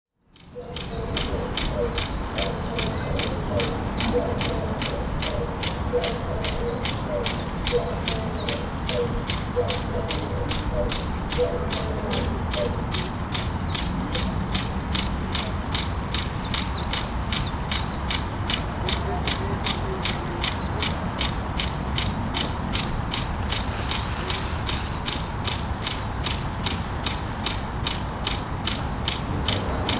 Soundscapes > Urban
Recording of a garden sprinkler, in the background you can hear birds, city-noise, and some people. Zoom F3 Rode NTG-5
birds, garden, lawn, outdoor